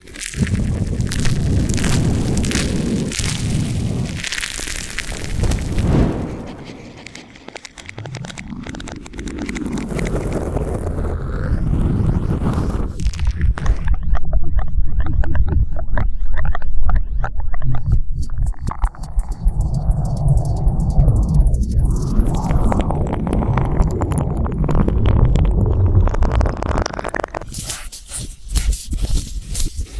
Sound effects > Experimental
ASMR FX sequence 11-rx2rv
an asmr sequence of close-up squishy sloshy organic sounds, recorded with various mics, home made piezo, and processed with Reaper
fx, spectrum, small